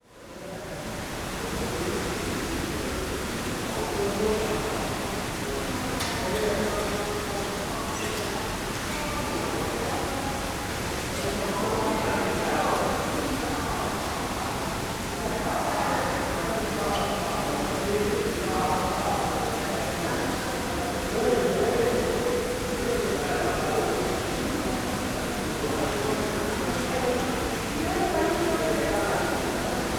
Soundscapes > Indoors
Bathhouse interior 1
Interior ambiance of the Hot Springs Bathhouse in Hot Springs, AR, USA. Voices can be heard but are unintelligible. Field recording. An Olympus LS-11 linear PCM recorder was used to record this 16-bit field audio recording.
ambiance, bathhouse, bathing, echo, field, flowing, interior, recording, water